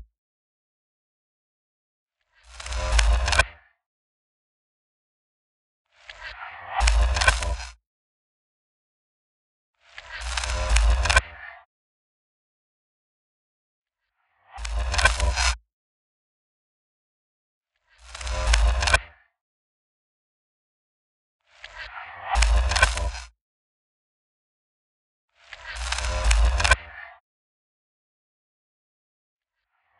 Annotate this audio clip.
Instrument samples > Synths / Electronic
minimal Cm
electronic, sound, sound-design, loop, glitch, minimal